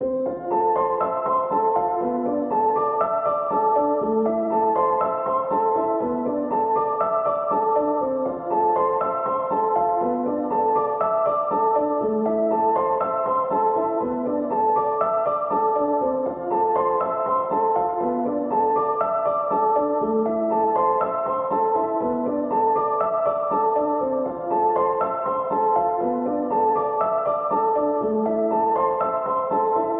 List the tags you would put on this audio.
Music > Solo instrument
120
120bpm
free
loop
music
piano
pianomusic
reverb
samples
simple
simplesamples

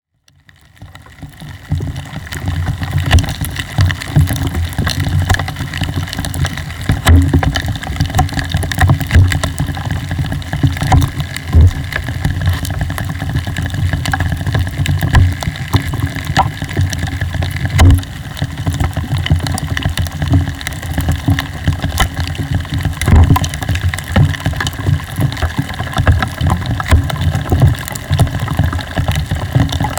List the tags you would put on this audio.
Soundscapes > Nature
field-recording
2x4
atmosphere
ambiance
wood-fire
flames
burn
sound-design
wood-burn
fire
nature
sparks
ambience
ambient
sound-effect
two-by-four
wood

